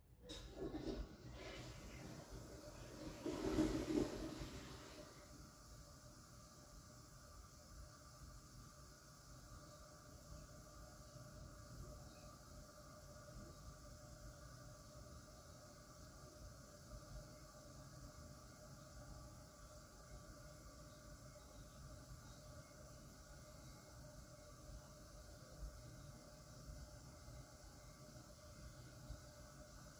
Sound effects > Objects / House appliances
WATRPlmb-Samsung Galaxy Smartphone, Distant Toilet Flush Nicholas Judy TDC
A distant toilet flushing.
flush, Phone-recording